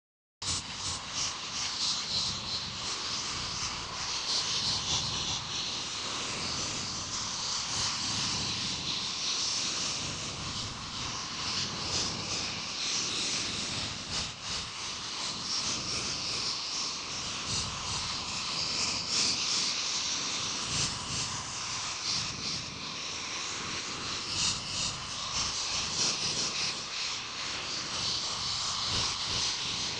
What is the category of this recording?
Sound effects > Electronic / Design